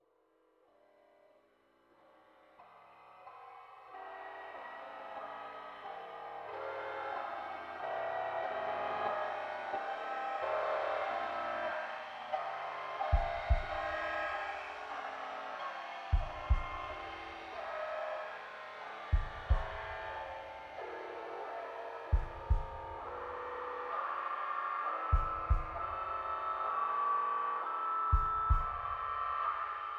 Music > Multiple instruments
Heartbeat of Venus
This instrumental piece drifts between dream and memory, unfolding in slow, hypnotic waves. Ethereal textures and reverb-soaked melodies create a sense of weightlessness, while subtle, melancholic undertones hint at something just out of reach—loss, longing, or quiet reflection. Minimal but emotionally resonant, it’s the kind of track that lingers long after it ends. Perfect for film soundtracks, especially in scenes that explore introspection, solitude, or surreal moments suspended in time.
music-for-film, melancholy, composer, music, hypnotic, instrumental, soundtrack, subtle, musical